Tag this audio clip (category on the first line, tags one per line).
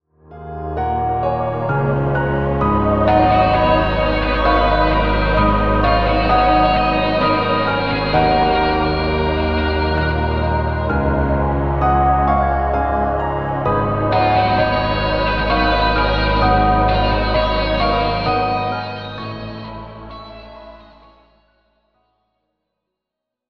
Music > Multiple instruments
bitter-music
blue-music
depressed-cinematic-theme
depressed-music
emotional-guitar
guitar-instrumental
han-saddboi
melancholy-music
melancholy-music-theme
passionate-guitar
sad-cinematic-music
sad-guitar
sad-guitar-theme
sad-instrumental-music
sad-instrumental-theme
sad-music
sad-theme
slow-sad-music
slow-sad-theme
tragic-cinematic-theme
tragic-instrumental-theme
tragic-theme